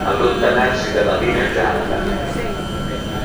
Soundscapes > Urban
Train Station Warning - Get Away from the Wite Stripe - Allontanarsi dalla Linea Gialla
Train station warning to get away from the white stripe because a train is approaching. Language is italian.
field-recording, italian, italy, rail, railway, station, train, trains, warning